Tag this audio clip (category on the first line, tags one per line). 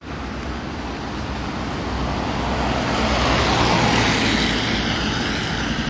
Sound effects > Vehicles
car
road
tire